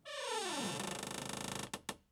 Sound effects > Objects / House appliances
wooden door creak4
A series of creaking sounds from some old door recorded with I don't even know what anymore.
wooden, foley, creaking, door, creak, old-door, horror